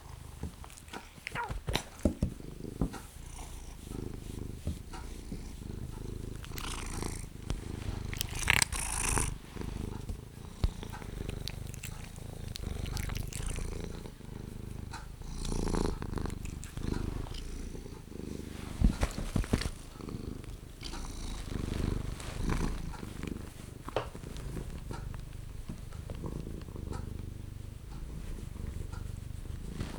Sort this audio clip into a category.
Sound effects > Animals